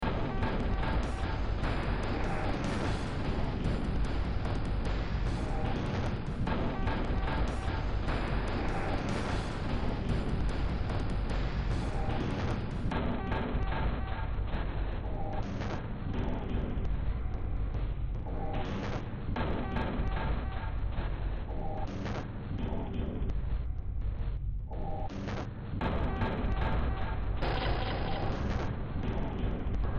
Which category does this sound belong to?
Music > Multiple instruments